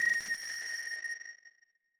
Instrument samples > Wind
A# Distorted Whistle
A#6 whistle played with reverb and distortion.
Asharp, distortion, reverb